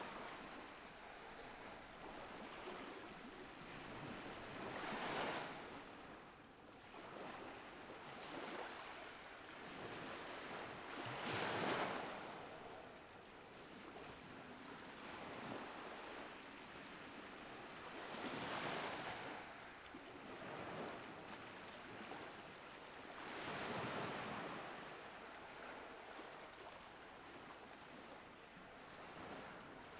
Soundscapes > Nature
Sea.Water.Wave.gull
Sea records with gull Recorded that sound by myself with Recorder H1 Essential